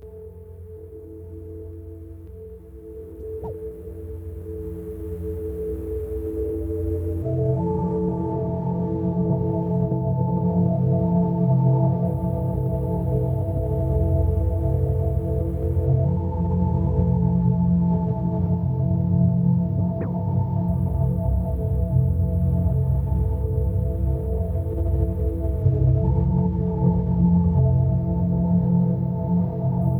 Music > Other
It gets really hard to tell where we're going in all this fog. Don't miss the details.
noise, rhodes, tape, ambient, glitch, loop, lofi, drone, dreamy